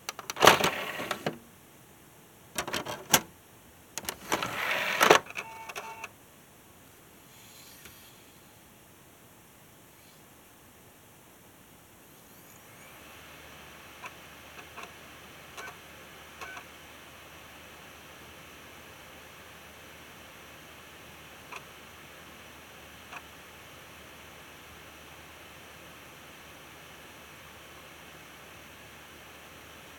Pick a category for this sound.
Sound effects > Objects / House appliances